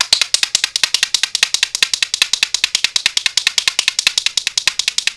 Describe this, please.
Music > Solo percussion
MUSCPerc-Blue Snowball Microphone, CU Musical Spoons, Fast Clacking, Looped Nicholas Judy TDC
Musical spoons clacking fast. Looped. Old cartoon typewriter clack or teeth chatter.
fast, chatter, teeth, musical-spoons, old, cartoon